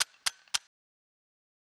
Sound effects > Other mechanisms, engines, machines
Ratchet strap cranking